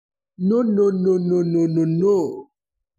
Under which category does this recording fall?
Speech > Solo speech